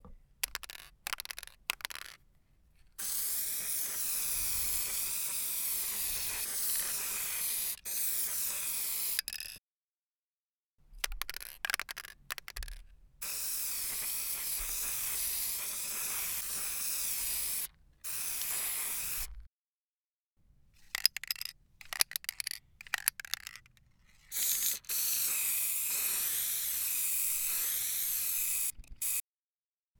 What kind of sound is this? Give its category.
Sound effects > Objects / House appliances